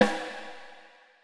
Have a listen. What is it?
Music > Solo percussion
Snare Processed - Oneshot 124 - 14 by 6.5 inch Brass Ludwig
oneshot, snares, realdrums, hits, sfx, snareroll, beat, drum, brass, snaredrum, acoustic, percussion, kit, roll, rimshot, hit, processed, snare, rimshots, rim, reverb, ludwig, flam, perc, drums, drumkit, realdrum, crack, fx